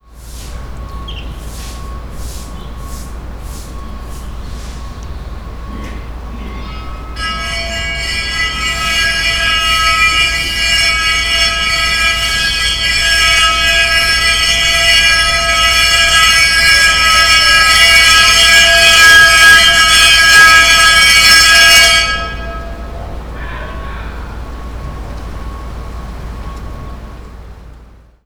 Sound effects > Human sounds and actions
Trash collector’s bell
The waste/refuse service in Oaxaca, Mexico, announces its morning collection round with a loud, clanging bell. Recorded in Oaxaca, Mexico, on a hand-held Zoom H2 in July 2023.